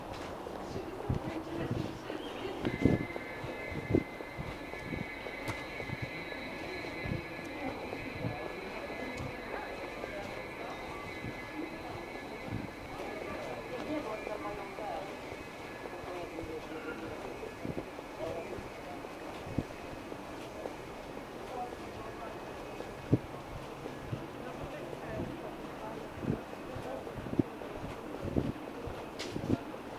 Soundscapes > Indoors
Rail Station Ambiance
Old recording, made probably with phone, during one of my 2015's walks around Gdynia. #0:37 Train horn #1:30 Station hall
rail, station, ambiance